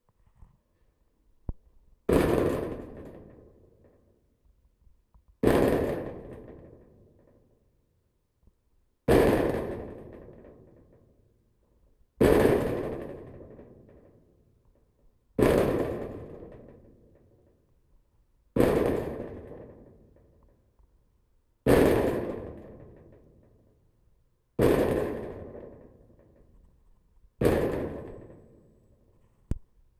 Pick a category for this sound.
Sound effects > Objects / House appliances